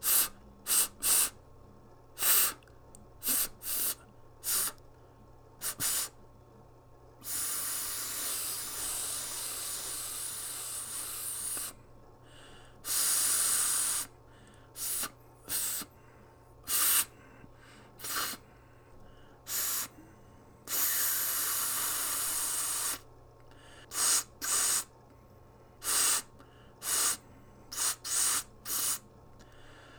Sound effects > Other mechanisms, engines, machines
TOONMisc-Blue Snowball Microphone, CU Air, Various Hisses, Releases, Human Imitation Nicholas Judy TDC
Various air hisses and releases. Human imitation.
air, Blue-brand, Blue-Snowball, cartoon, hiss, human, imitation, release, various